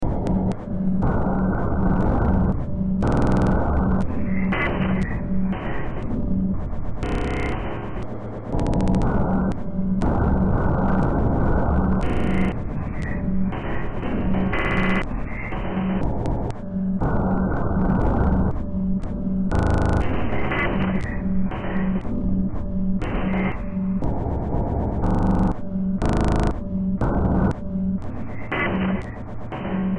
Music > Multiple instruments
Demo Track #3729 (Industraumatic)
Ambient, Cyberpunk, Games, Horror, Industrial, Noise, Sci-fi, Soundtrack, Underground